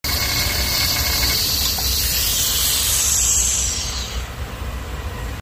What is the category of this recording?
Sound effects > Other mechanisms, engines, machines